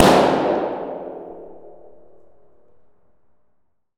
Soundscapes > Urban
I popped a balloon in a public overpass tunnel (Pispalan Kävelysilta near Vastavirtaklubi).